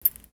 Sound effects > Other
METLImpt coin toss jingle cartoon ECG FCS2
The jingling of a necklace sounds like when you grab a coin in a video game